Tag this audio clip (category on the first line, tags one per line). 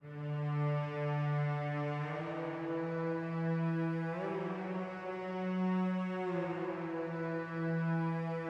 Instrument samples > String
bends
stems